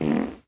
Sound effects > Human sounds and actions
FARTReal-Samsung Galaxy Smartphone, MCU Short, Low Nicholas Judy TDC
A short, lower fart.
low, cartoon, Phone-recording